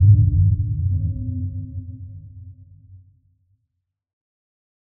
Sound effects > Electronic / Design
001 LOW IMPACT
BACKGROUND, BOOMY, RATTLING, HITS, LOW, DEEP, RUMBLING, PUNCH, RUMBLE, BASSY, IMPACT, HIT, IMPACTS